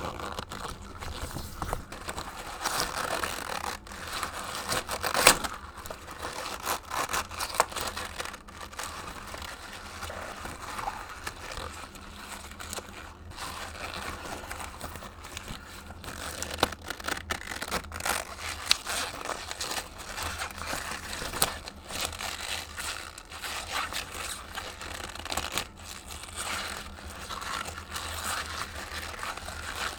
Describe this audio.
Sound effects > Objects / House appliances
RUBRFric-Blue Snowball Microphone, MCU Rubber, Stretch Nicholas Judy TDC
A rubber stretch.
Blue-brand, Blue-Snowball, cartoon, foley, rubber, stretch